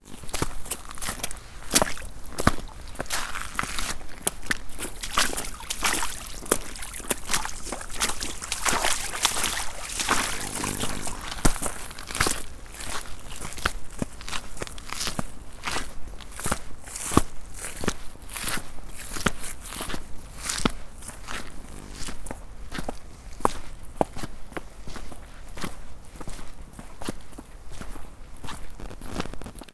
Soundscapes > Nature
Stamping Through Creek and River Stones , Fern Canyon Redwoods
Stomping and sloshing through a creek bed and river rocks recorded in the redwoods of california, Fern Canyon CA recorded on a Tascam D-05 field recorder